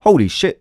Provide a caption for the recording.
Speech > Solo speech
Surprised - Holy shit
Subject : A mid20s male voice-acting for the first time. Check out the pack for more sounds. Objective was to do a generic NPC pack. Weather : Processing : Trimmed and Normalized in Audacity, Faded in/out. Notes : I think there’s a “gate” like effect, which comes directly from the microphone. Things seem to “pop” in. Also sorry my voice-acting isn’t top notch, I’m a little monotone but hey, better than nothing. I will try to do better and more pronounced voice acting next time ;) Tips : Check out the pack!